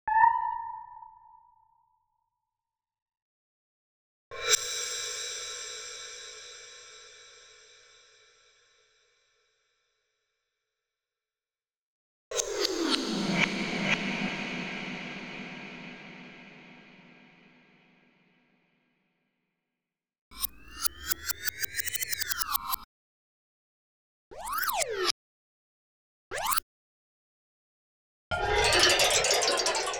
Sound effects > Electronic / Design
Radiator Sound FX
An experiment recording a metal radiator. Packed with multidimensional sounds generated through granular synthesis, pitch manipulation, and time stretching/compression. If you find these sounds useful, the full pack is available on a pay-what-you-want basis (starting from just $1). Your support helps me continue creating both free and paid sound libraries! 🔹 What’s included in the full pack?
abstractsound, acousticexperiment, ambient, electroacoustic, experimental, fieldrecording, foundsound, granularsynthesis, metaltextures, noise, pitchshifting, radiatorsounds, sonification, soundart, sounddesign, soundscape, timestretch, timewarp